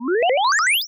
Sound effects > Other
5 - Healing damage Synthesized using ChipTone, edited in ProTools